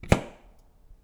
Sound effects > Objects / House appliances
The sound of the door security lock closing